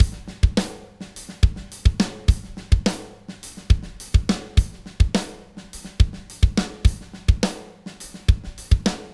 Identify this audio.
Music > Solo percussion
105 BpM - Groove Funky - 01
I just had some fun coming up with a few ideas on my drum kit. I used a Mapex Armory kit with tom sizes of 10", 12", 14", a kick with 20" and a snare with 14"x5,5".